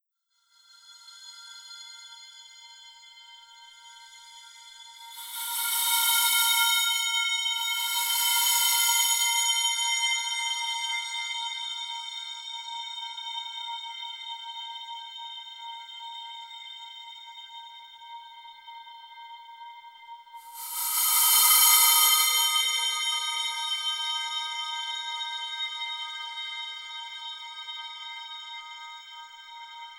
Sound effects > Experimental
Magic - Cymbal Swell 1
A shimmering, luminous effect achieved by processing the sound of cymbals. It creates a nice magical wave and a lasting echo. * Experimental sound.
game, witch, fairy, glimmering, priest, gleam, magical, bell, magic, tension, wizard, shimmering, sorcerer, golden, light, hit, sparkle, game-sound, adventure, spell, shimmer, magician, drama, gold, fantasy, glow, cymbal, effect, swell, glimmer